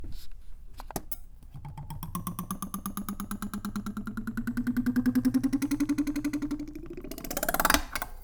Sound effects > Objects / House appliances
knife and metal beam vibrations clicks dings and sfx-078
Beam, Clang, ding, Foley, FX, Klang, Metal, metallic, Perc, SFX, ting, Trippy, Vibrate, Vibration, Wobble